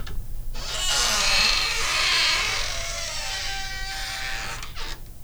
Sound effects > Objects / House appliances
squeaky wood door 02
Squeaky wood door opening
opening, open, wood, door, squeak